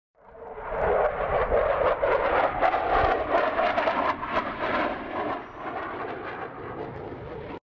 Sound effects > Vehicles
Jet Flyby 02
aircraft, airplane, flyby, flying, jet